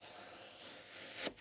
Sound effects > Other
Long slice vegetable 4
Potato being slowly sliced with a Santoku knife in a small kitchen.
Chef, Chief, Cook, Cooking, Cut, Home, Indoor, Kitchen, Knife, Slice